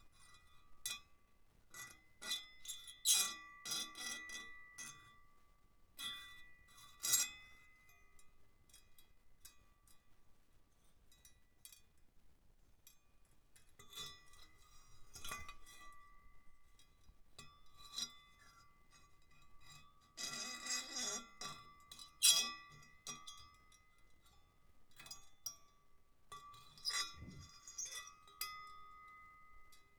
Instrument samples > Percussion
drum Scratch in the studio recorded in zoom h4n